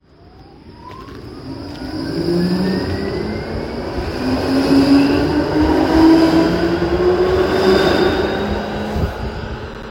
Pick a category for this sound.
Soundscapes > Urban